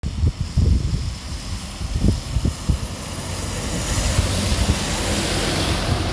Soundscapes > Urban
Bus moving at 25 kmph
Bus moving at 25 miles per hour: Rusty sound of gravel on the road, revving engine, street background sound. Recorded with Samsung galaxy A33 voice recorder. The sound is not processed. Recorded on clear afternoon winter in the Tampere, Finland.
street, field-recording